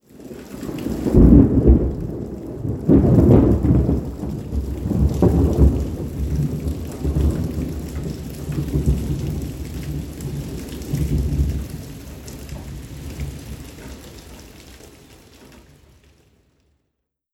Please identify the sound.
Sound effects > Natural elements and explosions
THUN-Samsung Galaxy Smartphone, CU Rolls, Rumbles Nicholas Judy TDC
Thunder rolls and rumbles.
Phone-recording, roll, rumble, thunder